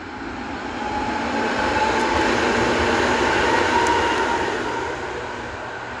Soundscapes > Urban
A Tram driving by at high speed in Hervanta/Hallila, Tampere. Some car traffic or wind may be heard in the background. The sound was recorded using a Samsung Galaxy A25 phone
Drive-by, field-recording, Tram